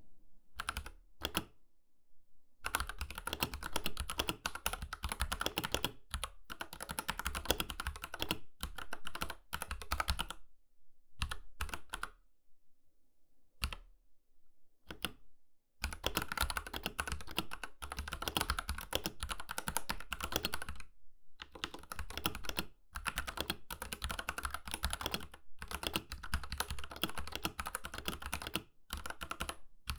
Sound effects > Objects / House appliances
Close-mic recording of mechanical keyboard typing.